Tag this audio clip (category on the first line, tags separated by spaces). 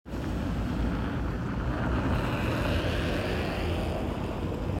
Sound effects > Vehicles
car vehicle automobile